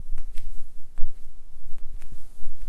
Sound effects > Human sounds and actions
Wet feet walking on the floor
walking, wet